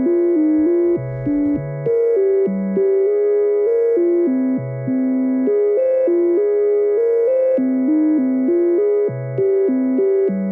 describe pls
Soundscapes > Synthetic / Artificial
This audio is a sonification of real-time forest microclimate data recorded at 12:18 PM on April 3rd, 2025 in Alice Holt Forest, UK, as part of the Sonic Forest project. The environmental sensors measured: Air Temperature: 18.91 °C average (18.95 °C max, 18.89 °C min) Relative Humidity (RH): 42.45 % Photosynthetically Active Radiation (PAR): 182.2 µmol m⁻² s⁻¹ average, total 10.93 mol m⁻² Net Radiation (NR): 49.9 W/m² average (max 53.07, min 38.33) CO₂ Concentration: 476.5 ppm average (max 477.4, min 475) Wind Speed: 0.604 m/s average (max 0.75 m/s) Battery Voltage: 13.63 V Sonification mapping: Pitch → controlled jointly by air temperature and CO₂ concentration. Reverb → primarily determined by relative humidity, with additional influence from air temperature and CO₂. Rhythmic pattern → driven by PAR levels. Vibrato → modulated by net radiation (NR). Background EQ → shaped by wind speed.